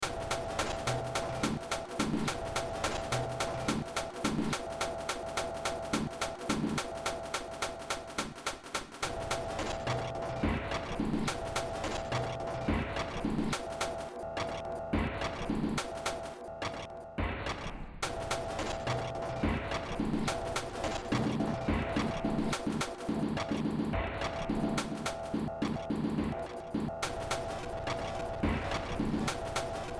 Music > Multiple instruments
Short Track #3364 (Industraumatic)
Horror, Ambient, Industrial, Soundtrack, Games, Underground